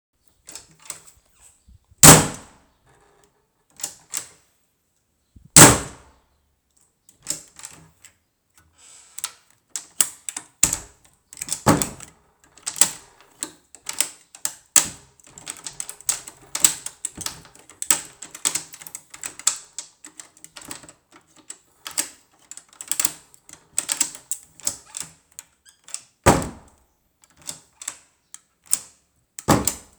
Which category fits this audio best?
Sound effects > Objects / House appliances